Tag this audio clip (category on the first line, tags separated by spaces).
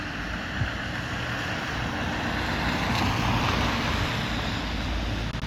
Soundscapes > Urban
car vehicle traffic